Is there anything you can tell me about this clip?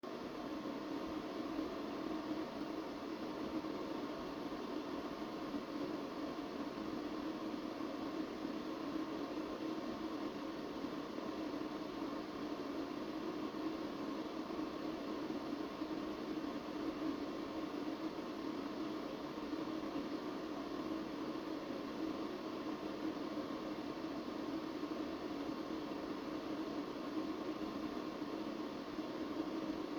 Sound effects > Objects / House appliances
White Noise Fan
This sound is steady, broadband white noise with a soft mechanical character—very similar to a fan or air purifier running continuously. How it feels and sounds: Consistent and uniform: There’s no melody, rhythm, or pulsing—just a constant wash of sound. Mid-to-high frequency weighted: It has a light “hiss” quality rather than a deep rumble, which is typical of air moving across blades or vents. Very low variation: No noticeable clicks, surges, or fluctuations; it’s smooth and stable. Mechanical but gentle: You can sense it’s produced by a machine, but it’s not harsh or grating. Soothing / masking: This type of sound is commonly used for sleep, focus, or noise masking because it blends into the background and reduces awareness of other sounds. Sound profile: Calm, Neutral, Slightly industrial, Background-friendly Common associations: Box fan, HVAC airflow, White noise machine, Air purifier, Bedroom or office ambient noise
white-noise, background-noise, general-noise